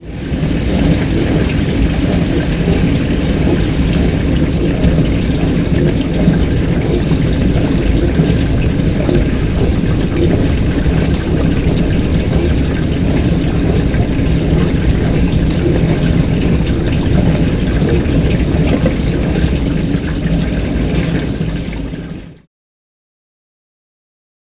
Sound effects > Objects / House appliances
dishwasher-running-close
A sound of dishwashed running from a close distance. Recorded with Zoom H6 and SGH-6 Shotgun mic capsule.